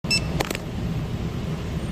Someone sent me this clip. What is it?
Sound effects > Electronic / Design
Scanner Beep SFX
This sound captures the beep from a hand held scanner electronic device.